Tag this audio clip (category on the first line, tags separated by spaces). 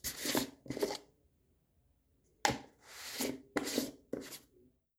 Sound effects > Objects / House appliances
lid screw unscrew foley cooling-pads top medicated Phone-recording